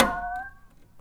Sound effects > Other mechanisms, engines, machines
Handsaw Pitched Tone Twang Metal Foley 25
foley, fx, handsaw, hit, household, metal, metallic, perc, percussion, plank, saw, sfx, shop, smack, tool, twang, twangy, vibe, vibration